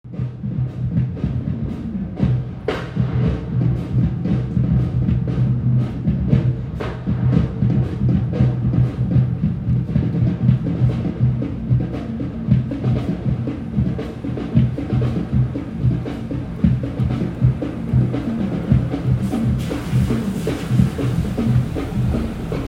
Music > Multiple instruments
High school marching band percussionists practicing outdoors.
drums, percussion, marching, march